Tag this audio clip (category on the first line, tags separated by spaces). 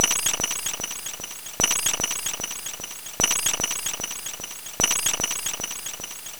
Instrument samples > Percussion
Loop Weird Dark Drum Soundtrack Loopable Industrial Alien Underground Ambient Packs Samples